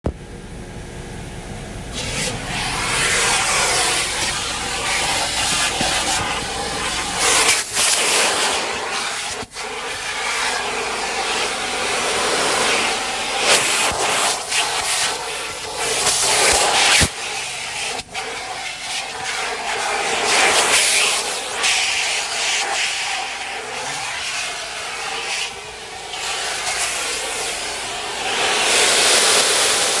Sound effects > Other mechanisms, engines, machines
Car vacuum being used on car interior.